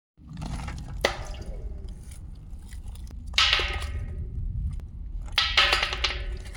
Sound effects > Natural elements and explosions
Rock Throw In Wet Sewage Tunnel
A rock thrown down a wet sewage tunnel recorded on my phone microphone the OnePlus 12R